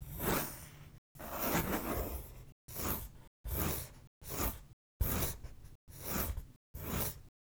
Sound effects > Objects / House appliances
Pencil stroke pass
Pencil scribbles/draws/writes/strokes and passes by the microphone.